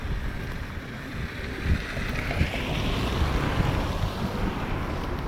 Sound effects > Vehicles
Car 2025-11-02 klo 13.27.56
Car, Field-recording, Finland